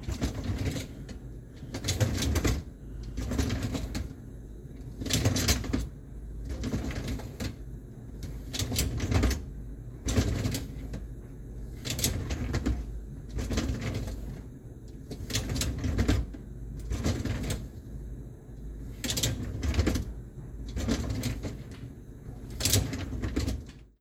Sound effects > Objects / House appliances
DRWRMisc-Samsung Galaxy Smartphone, MCU Dishwasher Drawer, Slide Open, Close Nicholas Judy TDC
A dishwasher drawer sliding open and closed.
close, dishwasher, drawer, foley, open, Phone-recording, slide